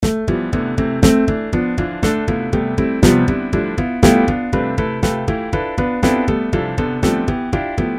Music > Multiple instruments
Piano dance
It is a piano song made with chrome music lab